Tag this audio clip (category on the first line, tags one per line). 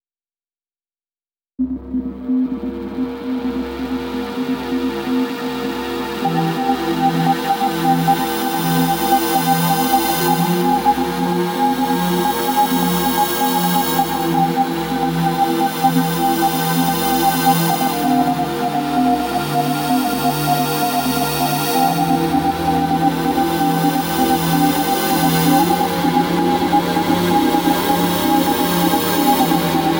Music > Multiple instruments
horror
movie
scary
scifi